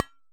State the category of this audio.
Sound effects > Objects / House appliances